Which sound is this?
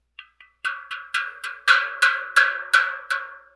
Sound effects > Objects / House appliances
aluminum can foley-012
alumminum, fx, household, sfx